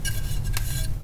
Music > Solo instrument
Marimba Loose Keys Notes Tones and Vibrations 27-001
notes, woodblock, wood, foley, percussion, perc, marimba, tink, oneshotes, rustle, loose, keys, fx, thud, block